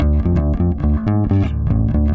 Music > Solo instrument
funky bass riff bam
bass, blues, note, harmonics, riffs, electricbass, notes, basslines, pluck, chuny, harmonic, bassline, pick, low, riff, lowend, chords, electric, slap, fuzz, rock, slides, slide, funk